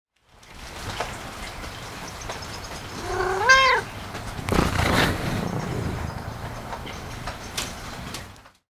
Soundscapes > Other
A Cat moans one time and then purr in the Microphone. Recorded Outdoor in the rain. Mono Omni Mic - Sennheiser MD21 Zoom F3
purr, Animal, mono, Rain, Cat, omni, zoomf3